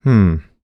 Speech > Solo speech
Doubt - Hmmm
doubt, Human, Male, Mid-20s, Neumann, skeptic, skepticism, talk, U67, Video-game, Vocal, voice, Voice-acting